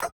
Instrument samples > Percussion
Glitch-Perc-Glitch Cymbal 12
Just retouched some cymbal sample from FLstudio original sample pack. Ramdomly made with Therapy, OTT, Fruity Limiter, ZL EQ.
Cymbal, Digital, Effect, FX, Glitch